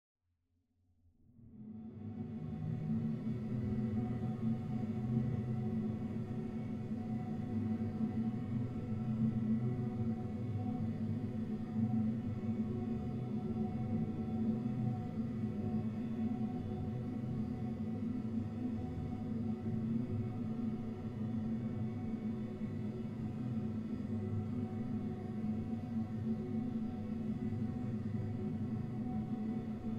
Sound effects > Experimental
under the city
I imagine this is what the underground of some city in the future would sound like. Don't ask why, I have no answers for you.